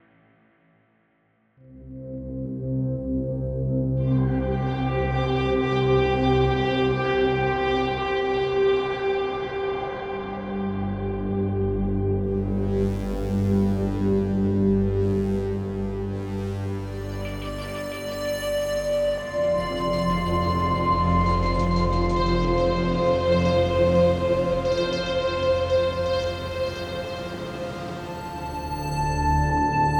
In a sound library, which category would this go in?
Soundscapes > Synthetic / Artificial